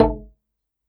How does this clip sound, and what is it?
Instrument samples > Other
Bass in A made by piece of string